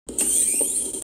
Sound effects > Objects / House appliances
office chair being lowered - recorded from my iphone
lowered, office, chair